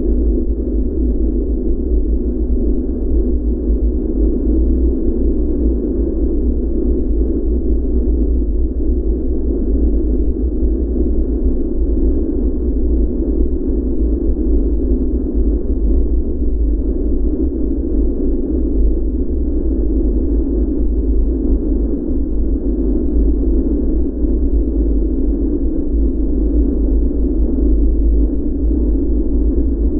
Sound effects > Experimental
"Knowing wasn't enough. He was tasked with ensuring the data had transferred from one station to the next." For this sound I used a Zoom H4n multitrack recorder to gather ambient noises in my home. I then molded and tinkered with those recordings using Audacity, until the final piece was ready to share with the world.
zoom-h4n, audacity, ambient, low, deep, movement, unstable, rough, slow, ragged